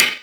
Instrument samples > Percussion
crash trigger pseudo-hi-hat 1
Avedis, bang, China, clang, clash, crack, crash, crunch, cymbal, Istanbul, low-pitched, Meinl, metal, metallic, multi-China, multicrash, Paiste, polycrash, Sabian, shimmer, sinocrash, sinocymbal, smash, Soultone, spock, Stagg, Zildjian, Zultan